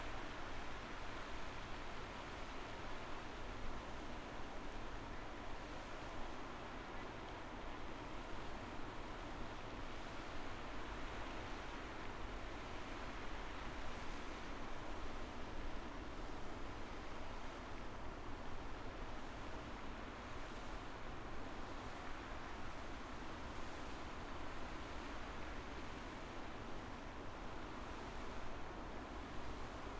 Soundscapes > Urban
WATRFoun Large fountain out in the town bay, heard from Stakholmen island, Karlskrona, Sweden
Recorded 15:12 10/05/25 A large fountain placed in the middle of the bay. Since it’s a bit away from land there’s not many other sounds than the water flowing, maybe some faint town ambience and people. Zoom H5 recorder, track length cut otherwise unedited.
Ambience, Bay, Daytime, Field-Recording, Flow, Fountain, Karlskrona, Large, Sweden, Town, Water